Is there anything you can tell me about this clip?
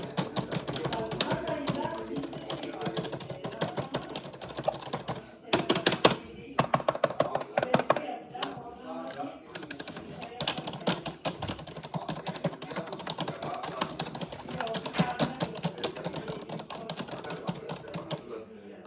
Sound effects > Other
Don't mind the background noises this house has more than 1 person anyways keyboard pressing noise and keyboard sounds from Logitech well i forgot the model but it says K400r on the back